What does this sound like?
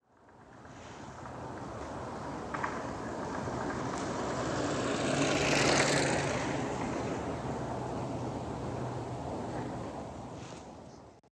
Sound effects > Vehicles
Bicycle pass right to left HZA
iPhone 16 stereo recording of a bicycle passing.
pedaling, bicycle, cycle